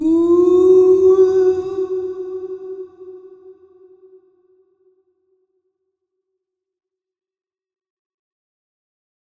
Music > Solo percussion
Angelic Female Vocal in Cathedral
A beautiful female vocal tone with a full reverberation tail echoing in a cathedral type space. Recorded with a Sure Beta 58a through a portable Audiofuse setup. Processed in Reaper using Raum, Fab Filter, and Izotope RX.
atmospheric, beautiful, big, cathedral, chant, chill, dj, echo, echoing, female, girl, loop, loopable, lulling, nice, oh, ooh, pretty, reverb, sample, sing, singing, sweet, tonal, tone, vocal, vocals, voice, woman, wonderful